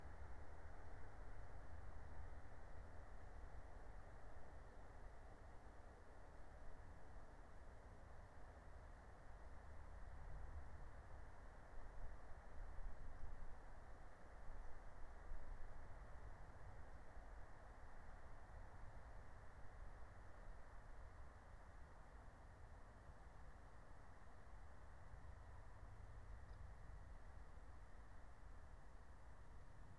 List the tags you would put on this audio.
Soundscapes > Nature
alice-holt-forest
meadow

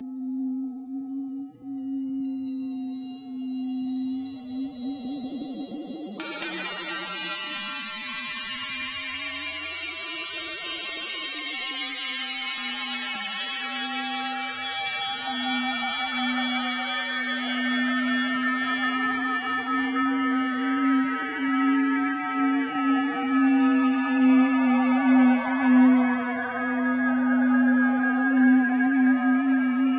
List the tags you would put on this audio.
Soundscapes > Synthetic / Artificial
glitchy
landscape
alien
sfx
dark
atmosphere
long
texture
ambient
effect
fx
rumble
drone
shifting
wind
synthetic
bassy
howl
shimmering
low
shimmer
ambience
evolving
slow
experimental
glitch
roar
bass

